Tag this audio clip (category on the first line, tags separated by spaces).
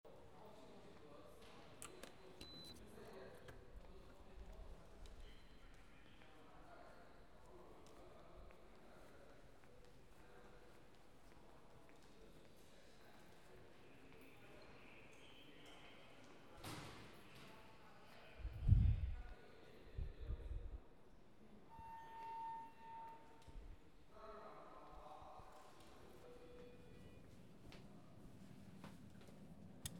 Soundscapes > Indoors
effects elevator sound